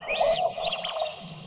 Soundscapes > Synthetic / Artificial
LFO Birdsong 46
Birdsong, LFO, massive